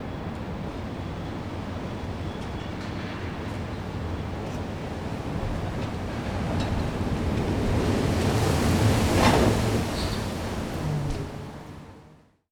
Sound effects > Natural elements and explosions
Storm Amy Gust in Urban Environment
A strong gust from Storm Amy blowing the bins over in the back court. ORTF, Line Audio CM4's.
field-recording, storm, storm-amy, urban, wind